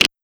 Sound effects > Human sounds and actions
LoFiFootsteps Stone Running-02
Shoes on stone and rocks, running. Lo-fi. Foley emulation using wavetable synthesis.